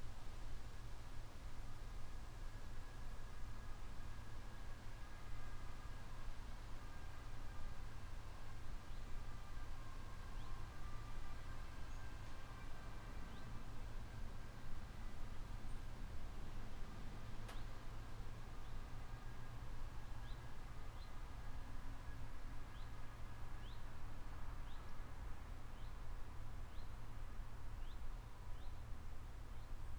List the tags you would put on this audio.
Soundscapes > Urban
Ambience
Back
Breeze
Buzzing
Condensing
Cooling
Daytime
Entrance
Field-Recording
Freezer
Halmstad
Humming
School
Sweden
Town
Traffic
Trees
Unit
Windy

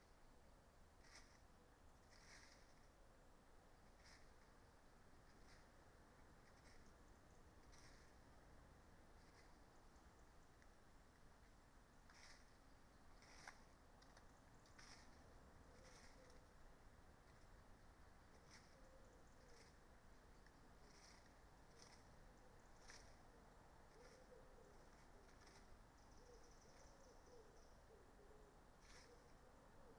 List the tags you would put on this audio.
Soundscapes > Nature
field-recording
modified-soundscape
alice-holt-forest
nature
sound-installation
Dendrophone
artistic-intervention
raspberry-pi
natural-soundscape
phenological-recording
data-to-sound
weather-data
soundscape

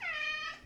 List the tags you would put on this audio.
Sound effects > Animals
meow
animal

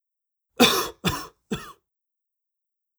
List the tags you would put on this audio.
Sound effects > Human sounds and actions
Cough
Coughing
Human
Male

